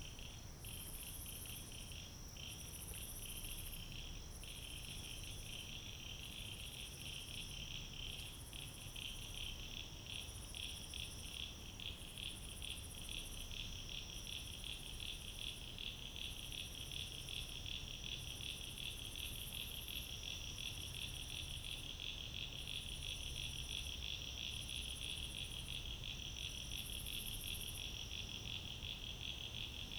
Soundscapes > Nature
Lake Sounds at Night - Rain at End